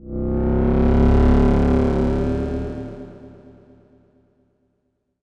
Sound effects > Experimental
Analog Bass, Sweeps, and FX-112
robotic, dark, sfx, machine, basses, retro, complex, korg, oneshot, analogue, scifi, sci-fi, robot, trippy, alien, snythesizer, sample, bassy, electro, weird, effect, analog, synth, pad, sweep, bass